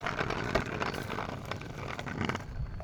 Soundscapes > Indoors
wood gears rolling
Sound recording when a wood double wheel rolling on hard surface.